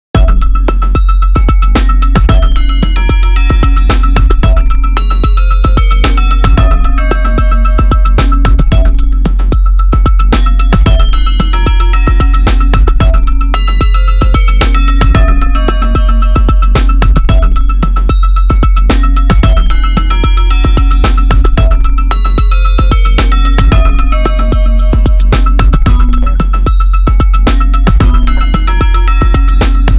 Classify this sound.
Music > Multiple instruments